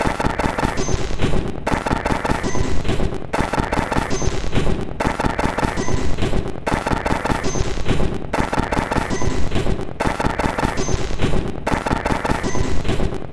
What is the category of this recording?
Instrument samples > Percussion